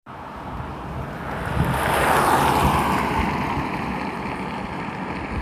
Soundscapes > Urban
voice 7 14-11-2025 car
CarInTampere, vehicle